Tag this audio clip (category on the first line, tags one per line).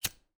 Sound effects > Objects / House appliances
flame
light
lighter
striking